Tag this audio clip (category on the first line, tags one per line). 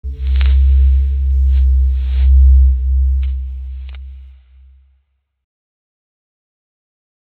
Instrument samples > Synths / Electronic
Ambient
Analog
bass
bassy
Chill
Dark
Deep
Digital
Haunting
Note
Ominous
Oneshot
Pad
Pads
Synth
Synthesizer
synthetic
Tone
Tones